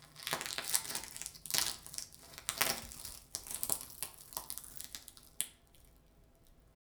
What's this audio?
Sound effects > Objects / House appliances
Crunching leaf 02
Stereo recording of small leaf being squished by hand. Reverb added